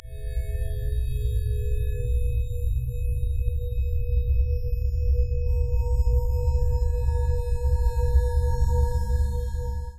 Sound effects > Electronic / Design
A Magic Source Made With Pigments and Processed through various GRM plugins, At first I was experimenting With Sci-Fi Engine Sounds and I came up with this along the way